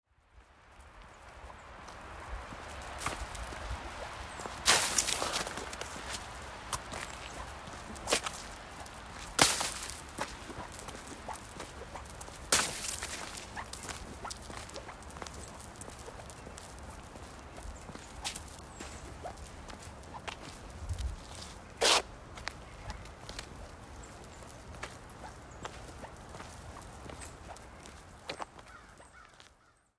Soundscapes > Nature
Winter Walk at Interlochen Arts Academy
Walk through Interlochen Center of the Arts in the Winter, kicking snow and walking on slush.
footsteps, michingan, winter